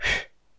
Sound effects > Human sounds and actions
A simple blowing sound made by my mouth, I use this for my game dev for stealth games that involve blowing out candles to remove lights.
Game, Puff, Blow